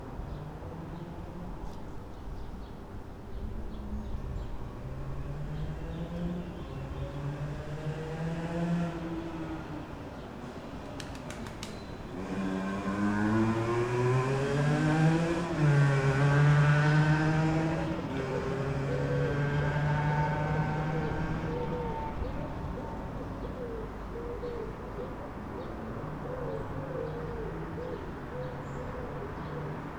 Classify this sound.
Soundscapes > Urban